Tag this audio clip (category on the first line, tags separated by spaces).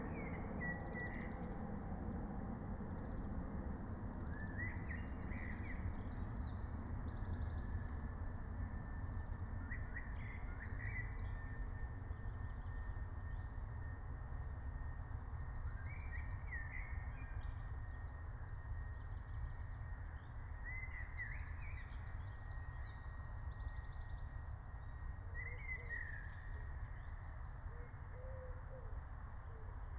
Soundscapes > Nature
phenological-recording raspberry-pi